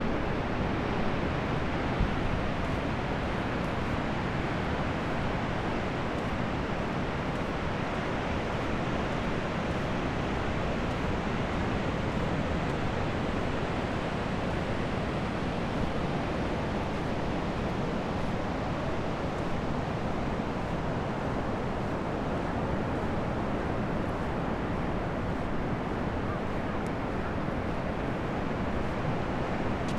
Soundscapes > Nature
Manzanita, 03/2025, footsteps on windy beach
Walking down the beach, sounds of footsteps and waves, the occasional sound of dog walking (but not barking)